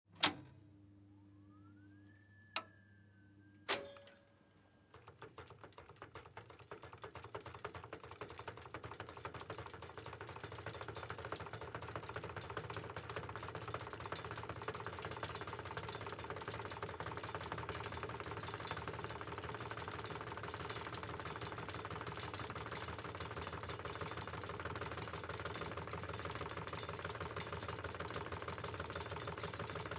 Sound effects > Other mechanisms, engines, machines
revox B77 MKII tape recorder rewind
B77, off, old, recorder, revox, rewind, tape, tape-recorder